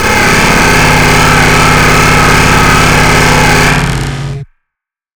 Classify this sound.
Sound effects > Other